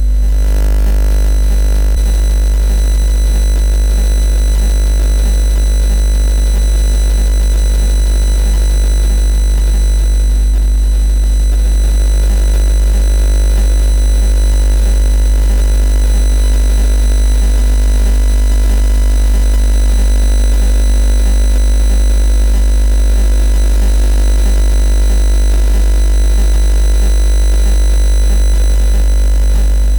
Soundscapes > Urban
Minami Urawa Station Bike Parking | Electromagnetic Listening
Electromagnetic listening! Captured in a bike parking area near Minami Urawa Station in Saitama City, Japan. Lots of wireless security cameras in the area. Captured with a Zoom H6 Essential recorder and a modified XLR cable as an antenna.